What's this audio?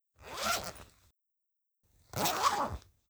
Sound effects > Objects / House appliances
Opening & closing zipper on a bag (alternation version)
An alternate version of opening and closing the zipper on a small bag. Made by R&B Sound Bites if you ever feel like crediting me ever for any of my sounds you use. Good to use for Indie game making or movie making. This will help me know what you like and what to work on. Get Creative!
opening, bag, zipper, open, closing, close